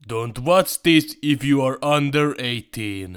Speech > Solo speech
dont watch this if you are under 18 deeper voice
male, warning, calm